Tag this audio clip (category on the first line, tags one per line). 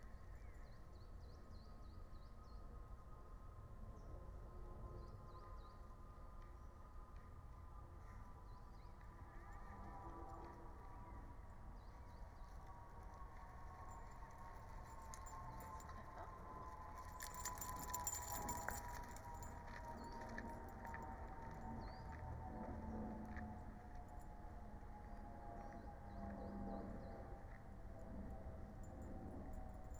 Soundscapes > Nature
alice-holt-forest
raspberry-pi
phenological-recording
natural-soundscape
field-recording
meadow
nature
soundscape